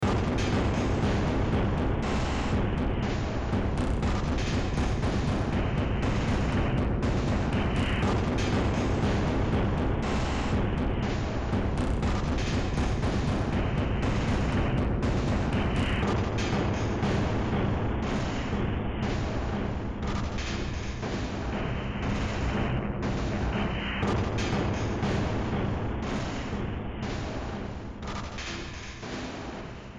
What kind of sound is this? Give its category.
Music > Multiple instruments